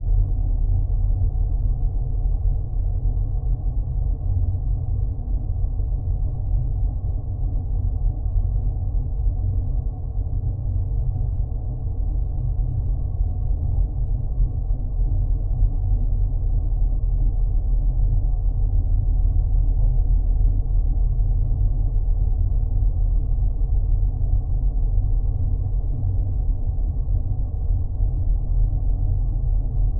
Sound effects > Other mechanisms, engines, machines
fx ferry metal railing geofon kengwai cct
LOM Geofon attached to a ferry metal railing on Mediterranean Sea. Recorded with a Tascam FR-AV2
Drone, Geofon, bass, ambient, pad